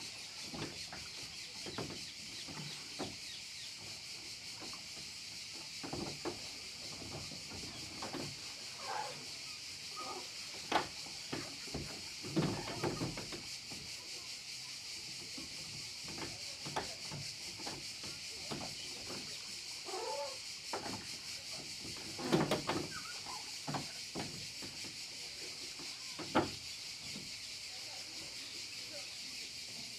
Soundscapes > Nature
18/07/2025 - Villa Borghese, Rome Morning on a row boat on a pond located in Villa Borghese] Zoom H2N